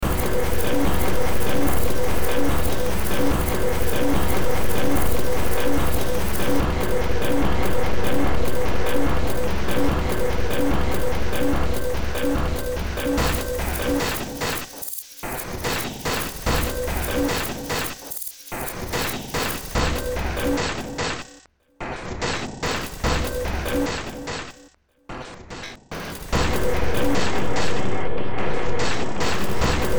Music > Multiple instruments
Short Track #3300 (Industraumatic)
Ambient, Cyberpunk, Games, Horror, Industrial, Noise, Sci-fi, Soundtrack, Underground